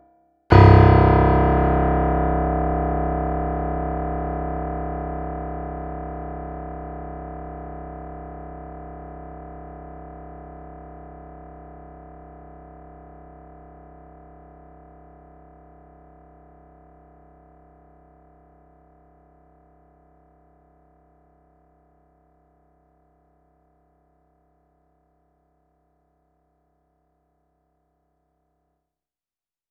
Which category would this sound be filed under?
Instrument samples > Piano / Keyboard instruments